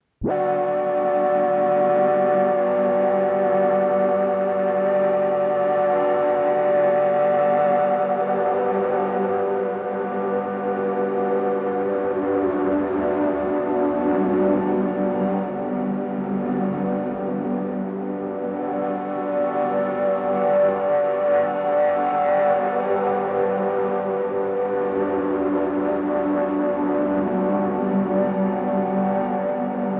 Music > Other
Stepping out into a world you hardly recognize.
lofi, tape